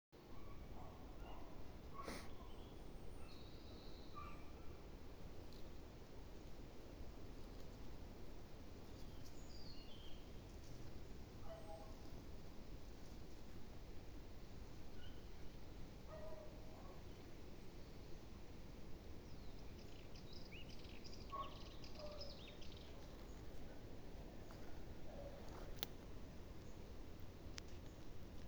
Soundscapes > Nature
dog, birds and forest ambience